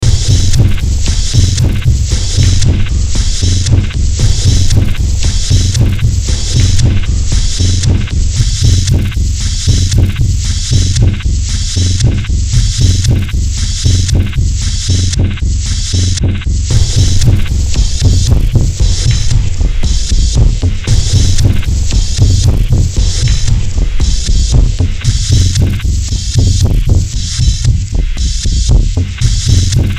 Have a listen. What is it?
Music > Multiple instruments
Demo Track #3135 (Industraumatic)
Ambient, Cyberpunk, Games, Horror, Industrial, Noise, Sci-fi, Soundtrack, Underground